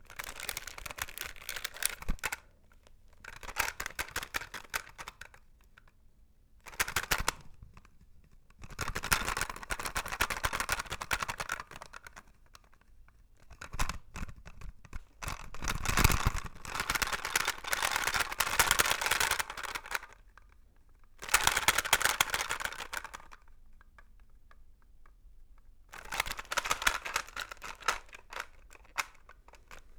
Sound effects > Objects / House appliances
Boneco de plástico articulado - articulated plastic doll
A plastic soldier doll recorded in a professional studio. Shaken, twisted.
toy, childs-toy, plastic